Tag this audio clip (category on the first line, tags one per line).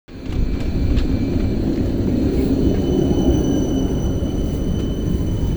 Sound effects > Vehicles
rail
tram
vehicle